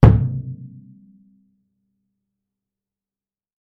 Music > Solo instrument
Kick Sonor Force 3007-002
Drum, Drumkit, Drums, kick, kit, low, oneshot, perc, percussion, toms